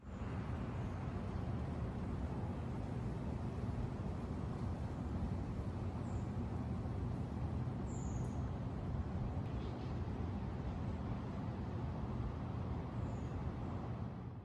Soundscapes > Nature
outdoor ambiance recording from a small town balcony with wind and distand bird sounds. Recorded with an iphone11 microphone.
ambiance, nature